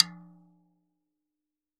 Music > Solo percussion
Hi Tom- Oneshots - 12- 10 inch by 8 inch Sonor Force 3007 Maple Rack
kit, perc, rimshot, drumkit, beatloop, oneshot, studio, tomdrum, roll, flam, beats, rim, toms, percs, percussion, hi-tom, drum, hitom, tom, velocity, beat, instrument, fill, drums, acoustic